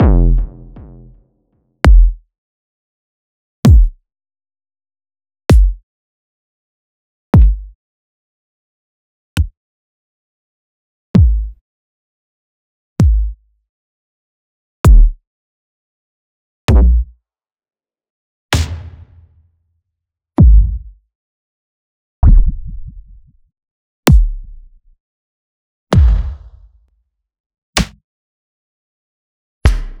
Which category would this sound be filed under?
Instrument samples > Percussion